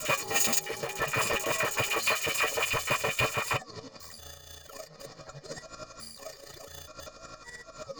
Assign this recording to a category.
Sound effects > Electronic / Design